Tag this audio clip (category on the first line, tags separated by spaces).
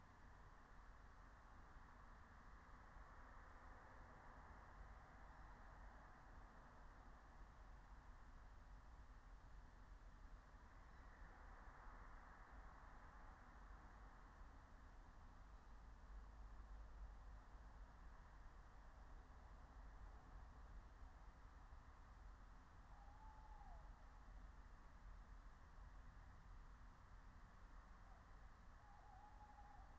Soundscapes > Nature
meadow soundscape natural-soundscape phenological-recording alice-holt-forest raspberry-pi nature field-recording